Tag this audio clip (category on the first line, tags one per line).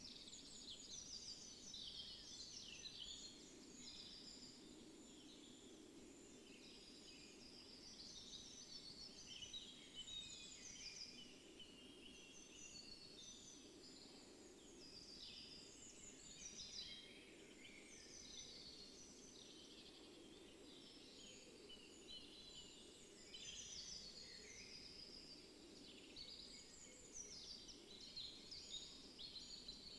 Soundscapes > Nature
alice-holt-forest
nature
Dendrophone
raspberry-pi
sound-installation
data-to-sound
artistic-intervention
natural-soundscape
soundscape
field-recording
weather-data
phenological-recording
modified-soundscape